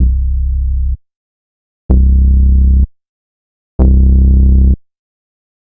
Instrument samples > Synths / Electronic
VSTi Elektrostudio (Model Pro)
bass, vst, synth